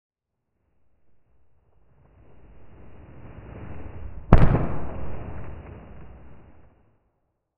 Sound effects > Natural elements and explosions
distant cannon or gunshot
Sound of football being kicked (slowed + reverb). Recorded on AT879 and mixed in Adobe Audition.
gunshot, explosion, cannon